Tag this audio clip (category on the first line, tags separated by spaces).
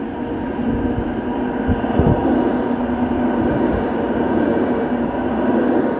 Sound effects > Vehicles
embedded-track moderate-speed passing-by Tampere tram